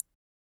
Sound effects > Objects / House appliances
Drop PipetteDripFast 2
pipette, water